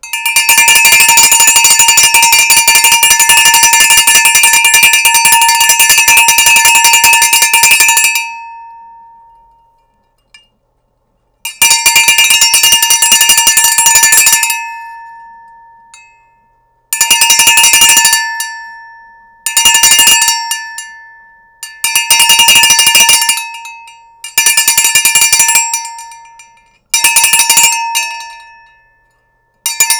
Music > Solo percussion
Medium cowbell shakes.